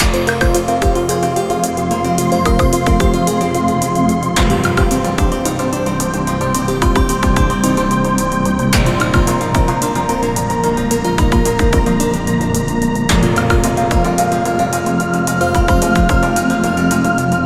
Music > Multiple instruments
Protoplanetary Loop (110 bpm, 8 bars) 2
Don't think it's particularly good compared with my more recent current stuff, but perhaps someone will find good use of it. The timbre and speed of this version are a bit different than with the first one.
sci-fi-music-loop, sci-ambient-theme, upbeat-sci-fi-loop, sci-fi-intro, sci-fi-110-bpm-loop, ambient-music-loop, sci-fi-podcast, energetic-music-loop, sci-fi-beat, upbeat-sci-fi-music-loop, sci-fi-110-bpm-beat, sci-fi-110-bpm-music-loop, 110-bpm, sci-fi-outro, music-loop, sci-fi-ambient-music, 110-bpm-loop, sci-fi-loop, 8-bar-loop, sci-fi-music-theme, 110-bpm-8-bar-loop